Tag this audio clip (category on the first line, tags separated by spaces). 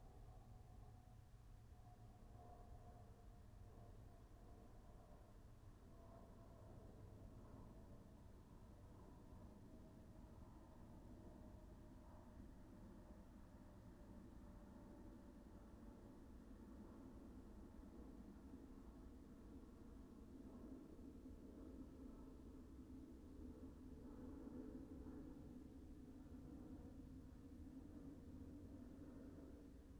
Soundscapes > Nature
alice-holt-forest meadow natural-soundscape phenological-recording raspberry-pi soundscape